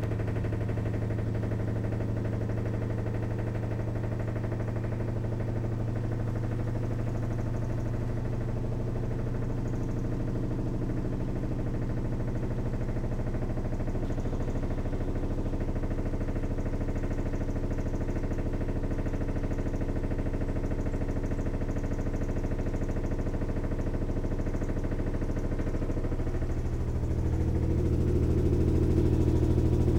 Sound effects > Other mechanisms, engines, machines
washing machine centrifugation phase
A washing machine during its final centrifugation phase, recorded at various angles while staying some time in each position. Might have other noises near the end. Recorded with Zoom H2.
centrifugation, centrifuge, machine, washing